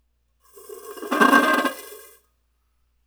Sound effects > Objects / House appliances
aluminum can foley-005
alumminum, can, foley, fx, household, metal, scrape, sfx, tap, water